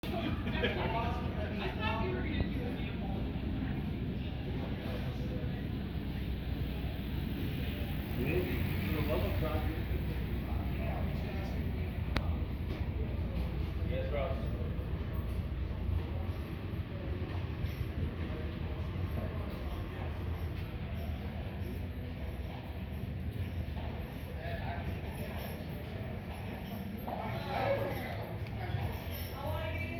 Soundscapes > Urban
Ambient late night street sounds, New Orleans
Ambient sounds from a French Quarter street afterr dark, includes a mule-drawn carriage passing
ambient, City, field-recording, New-Orleans, Public, Street-sounds